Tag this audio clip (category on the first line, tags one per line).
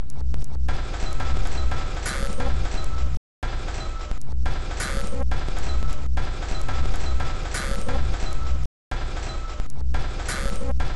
Instrument samples > Percussion
Alien
Weird
Industrial
Loop
Ambient
Packs
Drum
Loopable
Dark
Soundtrack
Underground
Samples